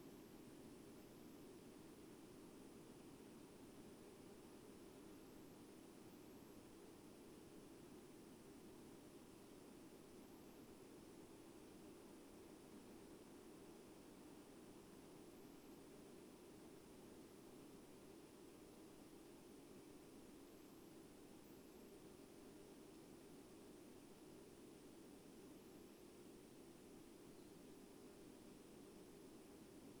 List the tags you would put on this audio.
Soundscapes > Nature
field-recording
sound-installation
phenological-recording
weather-data
natural-soundscape
raspberry-pi
artistic-intervention
Dendrophone
modified-soundscape
alice-holt-forest
nature
soundscape
data-to-sound

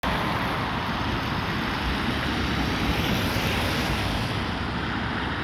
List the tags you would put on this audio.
Soundscapes > Urban
engine
vehicle

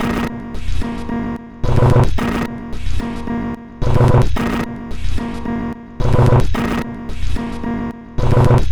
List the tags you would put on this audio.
Instrument samples > Percussion
Loop
Soundtrack

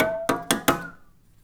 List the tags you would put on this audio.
Sound effects > Other mechanisms, engines, machines
foley
fx
handsaw
hit
household
metal
metallic
perc
percussion
plank
saw
sfx
shop
smack
tool
twang
twangy
vibe
vibration

